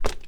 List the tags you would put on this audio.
Sound effects > Objects / House appliances
clack
click
industrial
plastic

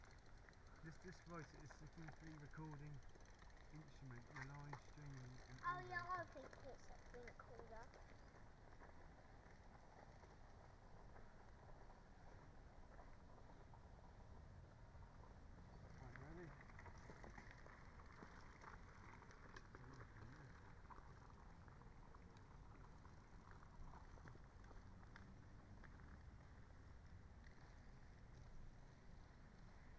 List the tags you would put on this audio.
Soundscapes > Nature
alice-holt-forest
nature
phenological-recording

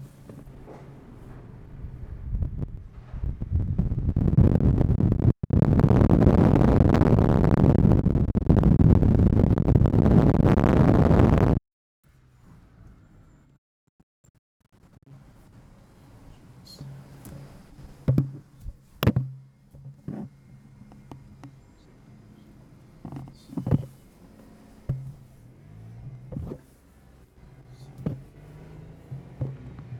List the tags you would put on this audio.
Soundscapes > Nature
clip
crackle
distort
field
nature
wing

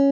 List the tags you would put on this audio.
Instrument samples > String
design
stratocaster
tone

